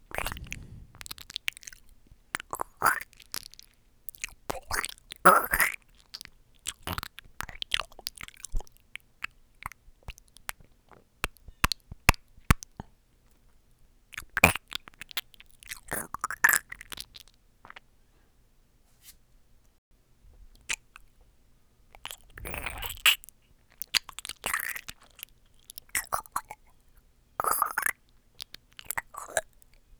Sound effects > Human sounds and actions
mouth squish noises
My mouth recorded through a measurement microphone into Audient Evo8. Trigger warning, it sounds gross.
splat, squish